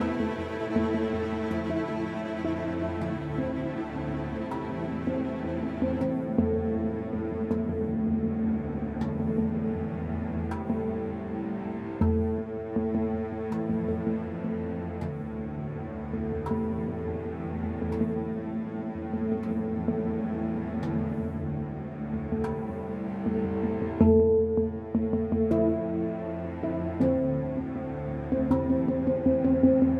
Music > Multiple instruments
Handpan & Strings Background Music Loop "Sacrificial Maulwurfkuchen"
A Track I composed to check out my new awesome Handpan VST. More will be following!